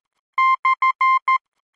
Sound effects > Electronic / Design
Morse DiagonalDerecha
A series of beeps that denote the slash sign in Morse code. Created using computerized beeps, a short and long one, in Adobe Audition for the purposes of free use.
Morse, Language, Telegragh